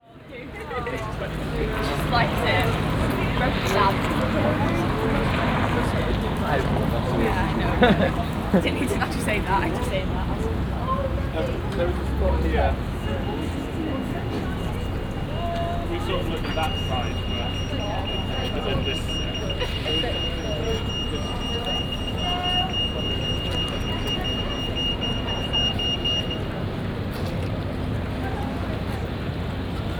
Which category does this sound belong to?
Soundscapes > Urban